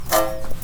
Sound effects > Other mechanisms, engines, machines
Handsaw Pitched Tone Twang Metal Foley 38
foley, fx, handsaw, hit, household, metal, metallic, perc, percussion, plank, saw, sfx, shop, smack, tool, twang, twangy, vibe, vibration